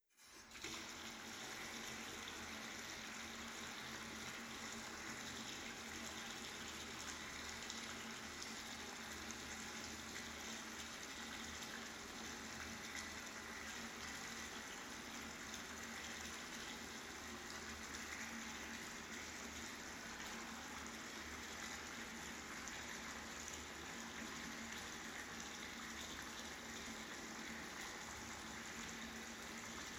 Sound effects > Objects / House appliances
WATRPlmb-Distant Bathtub Turn On, Run, Turn Off Nicholas Judy TDC
A bathtub turning on, running and turning off in distance.
turn-on, turn-off, ambience, bathtub, distance